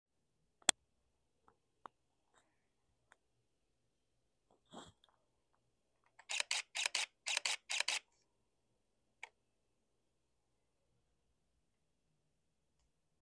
Sound effects > Electronic / Design
A Nikon 2012 professional camera takes multiple camera shots.
2012, shutter, camera, nikon, old, professional